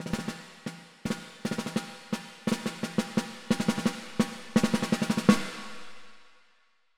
Music > Solo percussion
snare Processed - chill march - 14 by 6.5 inch Brass Ludwig
brass, oneshot, kit, processed, snares, drumkit, crack, realdrums, drums, snaredrum, acoustic, beat, rimshots, snareroll, realdrum, reverb, percussion, hit, sfx, flam, snare, fx, perc, drum, rimshot, ludwig, rim, roll, hits